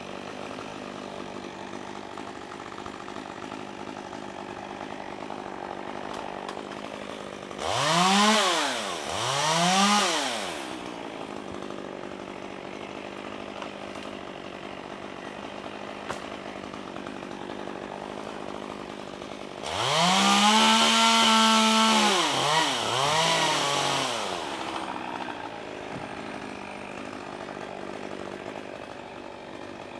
Soundscapes > Other
Stihl chainsaw
Crew from Malamute Tree Services uses a chainsaw to remove branches from a May tree at a residence in the Riverdale neighbourhood of Whitehorse, Yukon. Sounds include both idling and intermittent sawing. Recorded on handheld Zoom H2n in stereo on August 26, 2025.